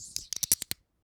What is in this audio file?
Sound effects > Objects / House appliances
Stereo recording of magnets placed on top of each other
magnet, magnetic, magnets, physics, rolled